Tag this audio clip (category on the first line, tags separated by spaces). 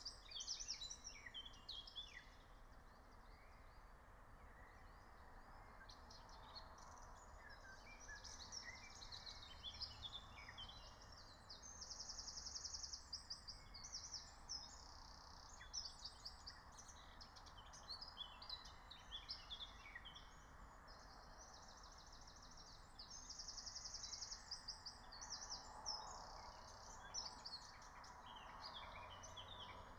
Soundscapes > Nature
phenological-recording natural-soundscape nature field-recording raspberry-pi meadow soundscape alice-holt-forest